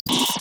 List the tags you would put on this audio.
Sound effects > Experimental
crack
glitch
percussion

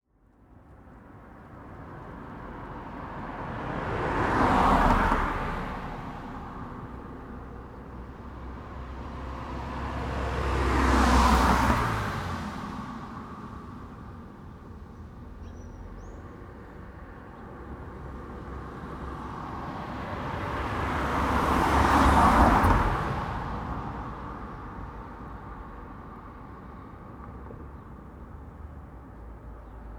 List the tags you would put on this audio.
Soundscapes > Urban
ambience
traffic
Field

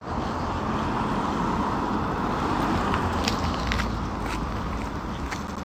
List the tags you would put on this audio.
Sound effects > Vehicles
car
road
tire

